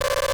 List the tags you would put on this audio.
Sound effects > Electronic / Design
8-bit clip fx game

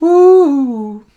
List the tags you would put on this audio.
Speech > Solo speech
chant dry FR-AV2 ho hoo hum hype Male Man Mid-20s Neumann oneshot ouhhh raw singletake Single-take Tascam U67 un-edited Vocal voice

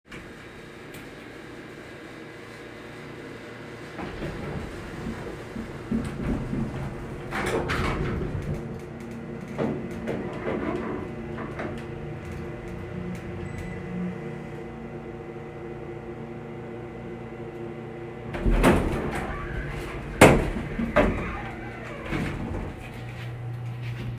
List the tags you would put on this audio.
Sound effects > Other mechanisms, engines, machines
doors lift

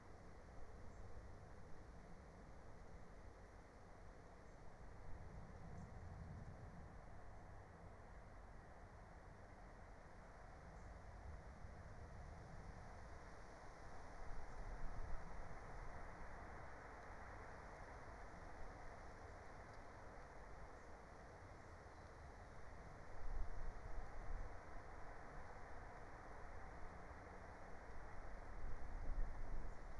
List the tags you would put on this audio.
Soundscapes > Nature
phenological-recording soundscape natural-soundscape field-recording meadow raspberry-pi alice-holt-forest nature

